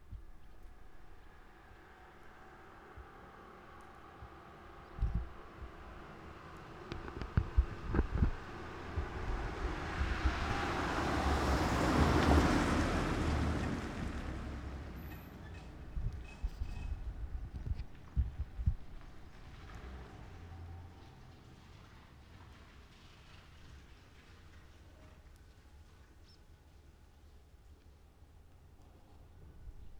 Soundscapes > Urban
Subject : Recording the church bells in Gergueil, from a small corner in the "Rue de la Barrière" street. Date YMD : 2025 September 02. Start rec at 06h58 ended 07h06 Location : 2 Rue de la Barrière, 21410 Gergueil. Sennheiser MKE600 with stock windcover. P48, no filter. A manfroto monopod was used. Weather : Clear sky, a little bit of wind (10km/h). 12°c ish Weather says "Humidity: 100%" I don't buy it... Processing : Trimmed and normalised in Audacity. Notes : Bells ring only at 7am, 12h mid day, 7pm. And a little before the hour (like 40s). No re-rings 5min after or whatnot.